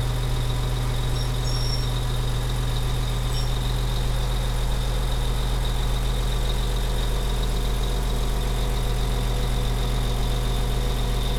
Sound effects > Vehicles
just a train sound on station
train, localtrain, station